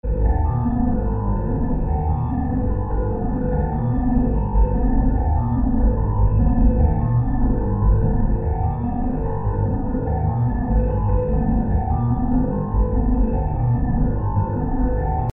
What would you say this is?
Music > Other
Creepy Detuned Arp
Reverb proccessed detuned arpeggio sound
140bpm, arpeggio, electronic, loop, music, synth